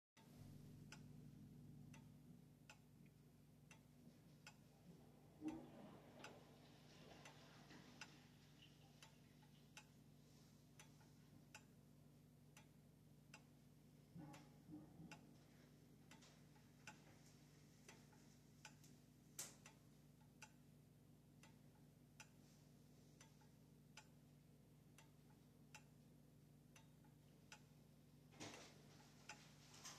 Sound effects > Objects / House appliances
Grandmother clock - family heirloom. Ticking, then full Westminster chimes, then strikes 12. Original field recording with no adjustments. Top casing was off the clock so you can also hear some of the gears and fly-wheel clicking.